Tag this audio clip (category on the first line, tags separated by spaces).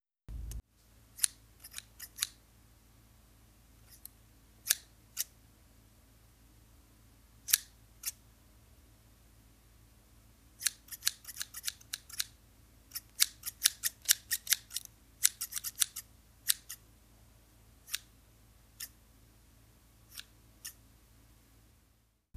Sound effects > Objects / House appliances
snip stationary scissors scissor sharp